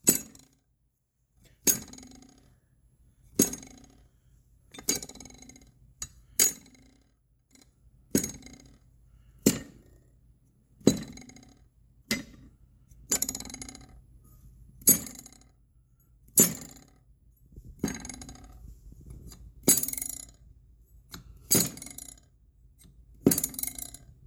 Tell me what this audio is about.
Sound effects > Objects / House appliances
A knife twang.